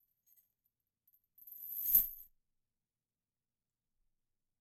Sound effects > Objects / House appliances
A Chain impact I made by slamming a chain into the rest of the chain. Is very quiet so raise the volume if possible